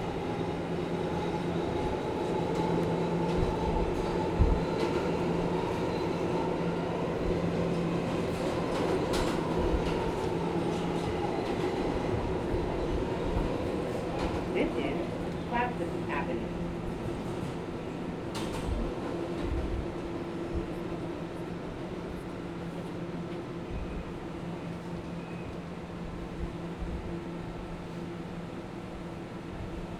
Soundscapes > Urban
Subway ride NYC
Riding inside the NYC train between stops Classon and Bedford Nostrand. Recorded on an H4n Pro. Doors open and close.
inside, metro, train